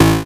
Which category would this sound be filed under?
Sound effects > Other